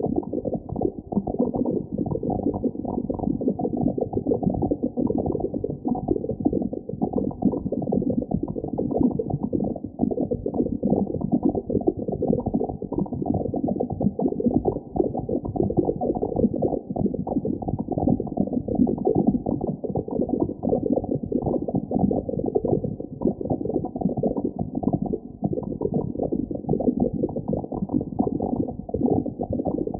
Soundscapes > Nature
Human Drops Water(Bubble 2)
Hi ! That's not recording sound :) I synth them with phasephant!
bubble, Droped, Water, Drown